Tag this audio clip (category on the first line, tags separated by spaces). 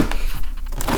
Sound effects > Other mechanisms, engines, machines
rustle tools bam metal boom sfx crackle foley little thud shop strike oneshot knock perc bang bop fx sound percussion tink pop wood